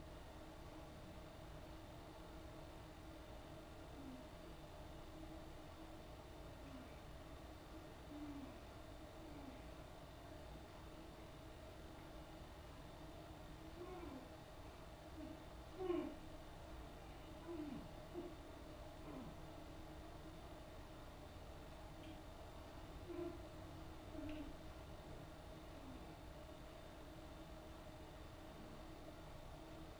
Sound effects > Human sounds and actions
sex noises
moan, orgasm, sex